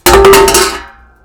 Sound effects > Objects / House appliances
METLImpt-Blue Snowball Microphone, CU Bucket, Drop Nicholas Judy TDC
A metal bucket drop.